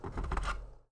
Sound effects > Objects / House appliances
A telephone receiver being picked up.